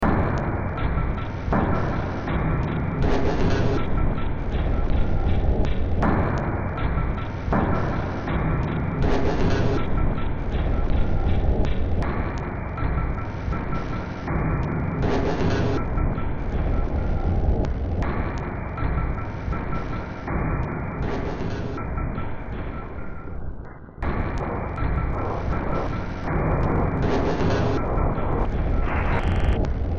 Music > Multiple instruments
Demo Track #3719 (Industraumatic)
Sci-fi, Underground, Industrial, Noise, Games, Soundtrack, Ambient, Horror, Cyberpunk